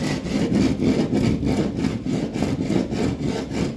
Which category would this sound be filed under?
Sound effects > Objects / House appliances